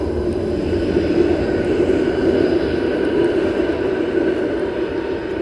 Soundscapes > Urban
Audio of tram passing by. Location is Tampere, Hervanta. Recorded in winter 2025. No snow, wet roads, not windy. Recorded with iPhone 13 mini, using in-built voice memo app.
tampere, tram, vehicle